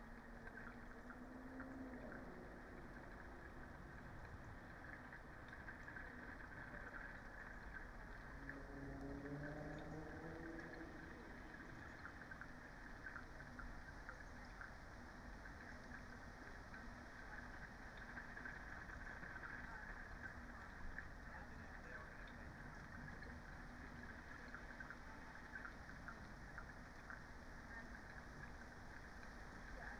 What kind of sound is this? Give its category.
Soundscapes > Nature